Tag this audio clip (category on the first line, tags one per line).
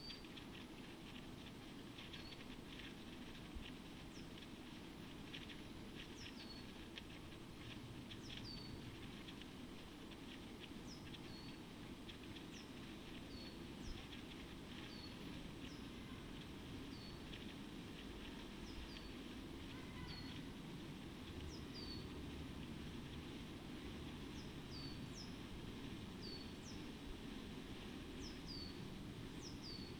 Soundscapes > Nature
nature
modified-soundscape
raspberry-pi
artistic-intervention
field-recording
alice-holt-forest
natural-soundscape
Dendrophone
soundscape
phenological-recording
data-to-sound
weather-data
sound-installation